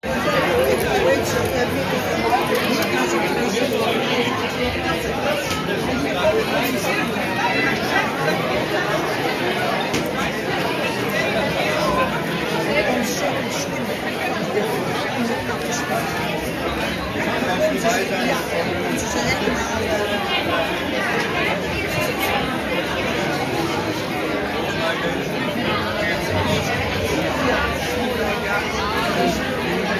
Speech > Conversation / Crowd
Dutch walla busy intern small hall
iPhone 6 stereo recording of Dutch crowd.